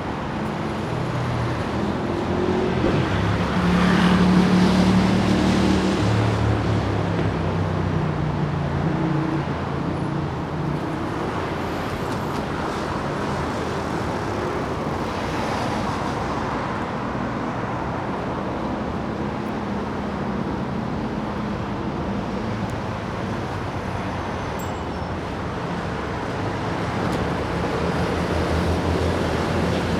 Soundscapes > Urban
20251024 EstacioLaCampana Cars Bike Humans Voices Stressful
Voices, Stressful, Cars, Humans, Bike